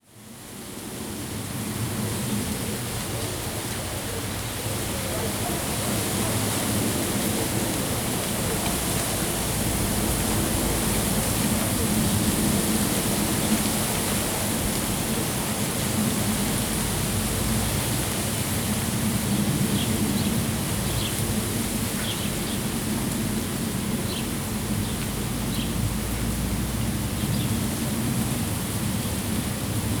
Soundscapes > Nature
wind in a sophora japonica, sounds of countryside
Un peu de vent enregistré à l 'ombre d'un sophora japonica. On entend quelques oiseaux, des chiens, le train qui passe, un peu d'activité humaine, des voitures. Bref, la campagne quoi ! ---------------------------------- A bit of wind recorded in the shade of a Sophora japonica. You can hear some birds, dogs, the passing train, a bit of human activity, cars. In short, the countryside!
bids, campagne, ete, nature, oiseaux, summer, vent, wind